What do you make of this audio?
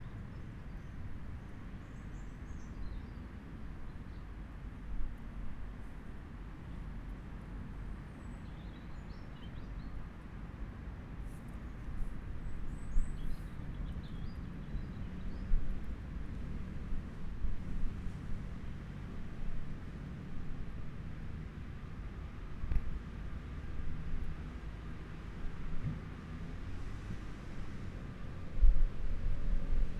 Soundscapes > Nature
STeDe tree birds 11.19am
Recorded with zoom H1 essential
TreeAndBirds, FieldRecording